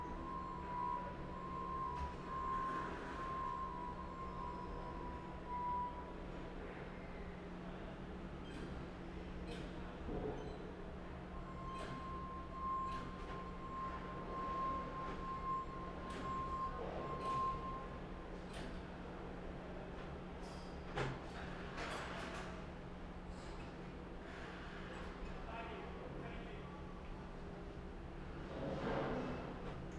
Soundscapes > Urban
Sky Princess being loaded at Southampton Docks Lots of beeping and banging/crashing from the loading machinery.